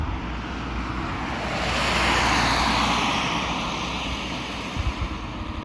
Soundscapes > Urban
auto17 copy
traffic, car, vehicle